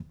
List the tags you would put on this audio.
Sound effects > Objects / House appliances
bucket carry clang container debris drop fill foley garden handle hollow household kitchen knock object pail plastic scoop shake slam spill tip tool water

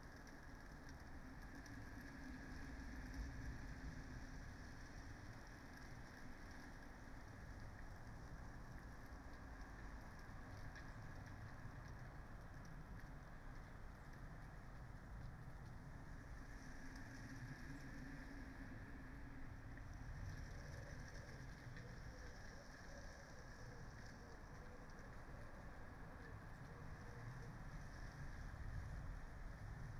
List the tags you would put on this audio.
Soundscapes > Nature
sound-installation Dendrophone alice-holt-forest phenological-recording data-to-sound artistic-intervention field-recording nature natural-soundscape modified-soundscape soundscape weather-data raspberry-pi

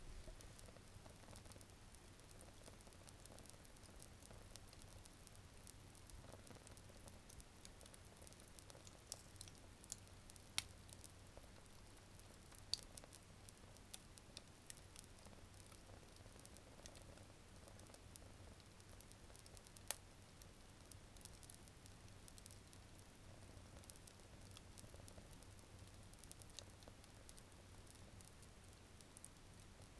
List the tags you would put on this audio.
Soundscapes > Indoors
ambient atmosphere background cozy crackle field-recording fire nature soundscape stove warm

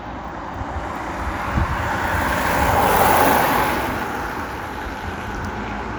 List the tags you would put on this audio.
Soundscapes > Urban
Car Drive-by field-recording

